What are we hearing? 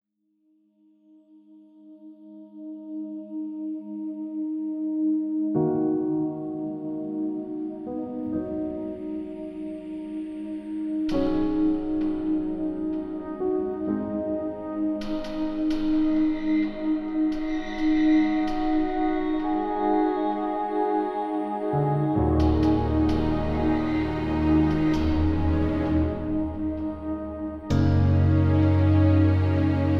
Music > Multiple instruments
Hopeful Piano/String Cinematic Ambience Drama Background Music
A musical snippet of some dramatic and hopeful emotional cinematic sounding background music. Could be used for film, video game, or anything else you can think of! Composed and produced by myself!
ambience, atmosphere, background, bgm, chords, cinematic, drama, dramatic, emotional, film, finale, hopeful, medevil, melancholic, movie, orchestral, pad, percussion, piano, relaxing, sad, slow, soundtrack, strings, vgm